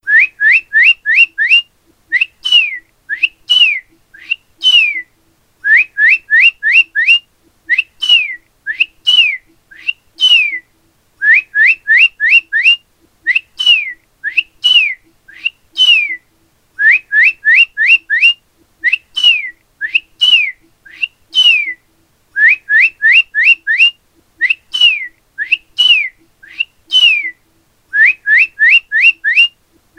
Sound effects > Animals
TOONAnml-Blue Snowball Microphone, CU Bird Call, Red Cardinal Nicholas Judy TDC
A red cardinal. Human imitation.
bird, Blue-brand, Blue-Snowball, call, cartoon, human, imitation, red-cardinal